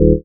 Instrument samples > Synths / Electronic
WHYBASS 4 Eb
additive-synthesis, fm-synthesis, bass